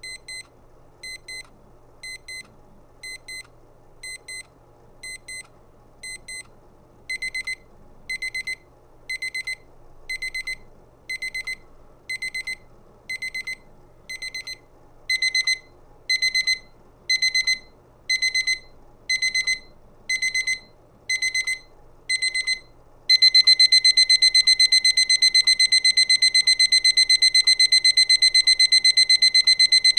Sound effects > Objects / House appliances
ALRMClok-Blue Snowball Microphone, CU Travel Alarm Clock, Beeping In Various Intensities, Speeds Nicholas Judy TDC
A travel alarm clock beeping in various intensities and speeds.
alarm, beeping, Blue-brand, Blue-Snowball, clock, intensities, speeds, travel, various